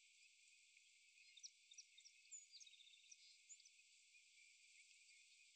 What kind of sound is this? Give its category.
Soundscapes > Nature